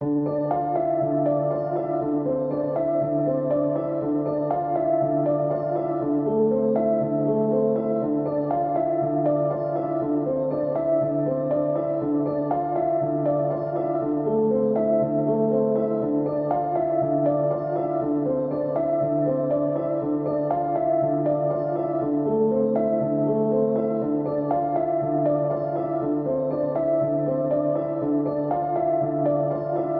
Music > Solo instrument
Piano loops 183 efect 4 octave long loop 120 bpm
loop, 120, simplesamples, piano, music, simple, pianomusic, samples, reverb, free, 120bpm